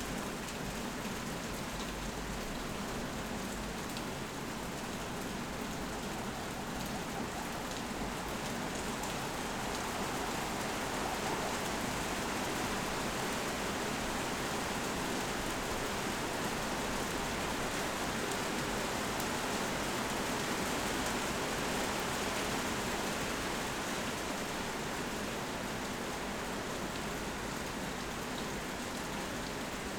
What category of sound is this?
Sound effects > Natural elements and explosions